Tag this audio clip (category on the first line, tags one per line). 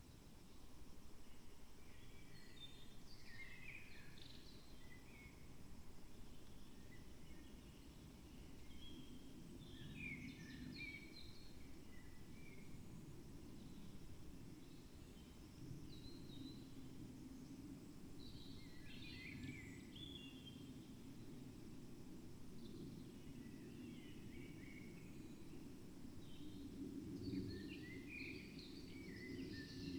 Soundscapes > Nature
field-recording
sound-installation
artistic-intervention
modified-soundscape
alice-holt-forest
phenological-recording
raspberry-pi
data-to-sound
soundscape
Dendrophone
natural-soundscape
weather-data
nature